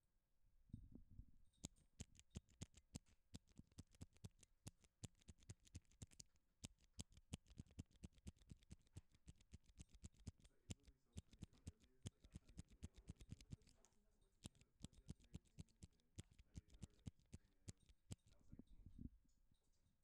Sound effects > Objects / House appliances
I use my mechanical pencil and click it multiple times.